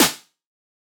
Instrument samples > Percussion
snare classy
made with vital